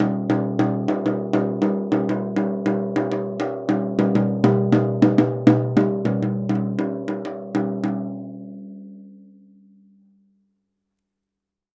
Music > Solo instrument
Toms Misc Perc Hits and Rhythms-013
Kit, Sabian, Metal, Oneshot, Drums, Cymbal, Crash, Ride, Hat, FX, Percussion, Custom, Cymbals, GONG, Perc, Paiste, Drum